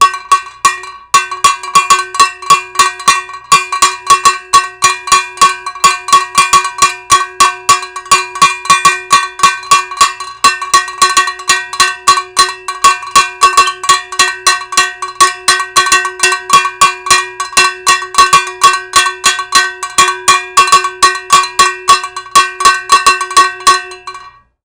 Music > Solo percussion
MUSCBell-Blue Snowball Microphone, CU Cowbell, Latin Rhythm Nicholas Judy TDC
A latin cowbell rhythm.